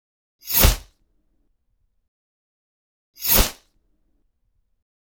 Sound effects > Objects / House appliances
tmnt2012 rwby or DMC inspired sword swing w heavy woosh and Scrape 06052025
custom tmnt 2012, rwby, and dmc3 inspired heavy sword swing whoosh w scrape sound.